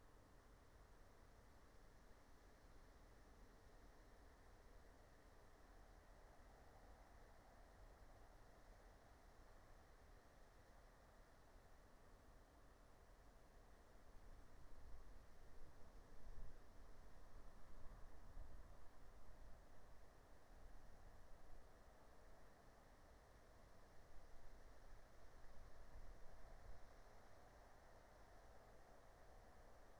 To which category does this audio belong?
Soundscapes > Nature